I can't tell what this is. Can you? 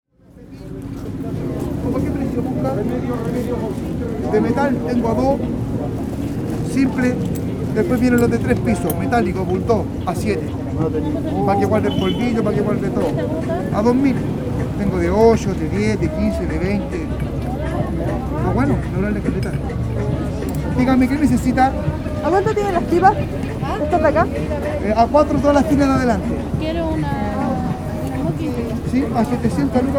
Speech > Conversation / Crowd
Vendedor de grinder smoking
Vocal sound of a street vendor on a bus offering smoking grinders and in conversation with customers.
South, Chile, America, recording, field, Valparaiso, vendor